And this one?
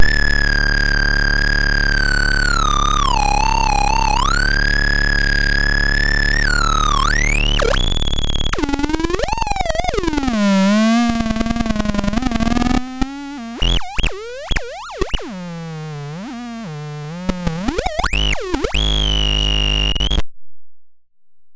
Sound effects > Electronic / Design
Optical Theremin 6 Osc dry-099
Noise, Analog